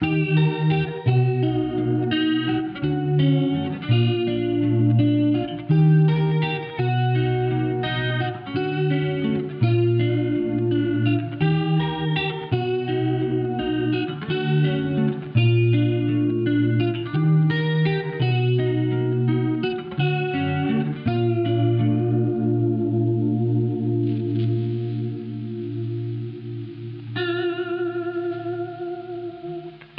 Music > Solo instrument
Psychedelic Guitar Dreampop - Jazzmaster Fender Mexico
dreamlike, echo, guitar